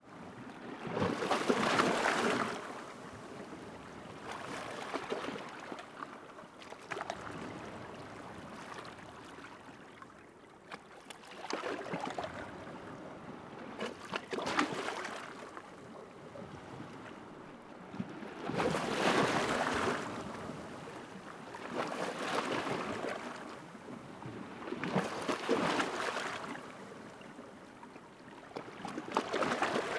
Soundscapes > Nature
Sea.Water.Waves.Splash.
Sea records with splashing Recorded that sound by myself with Recorder H1 Essential
Water, Sea-Water-Splash, Splash, Sea